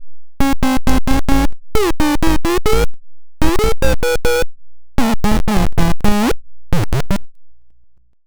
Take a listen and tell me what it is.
Sound effects > Electronic / Design
Optical Theremin 6 Osc dry-032
Alien, Analog, Bass, Digital, DIY, Dub, Electro, Electronic, Experimental, FX, Glitch, Glitchy, Handmadeelectronic, Infiltrator, Instrument, Noise, noisey, Optical, Otherworldly, Robot, Robotic, Sci-fi, Scifi, SFX, Spacey, Sweep, Synth, Theremin, Theremins, Trippy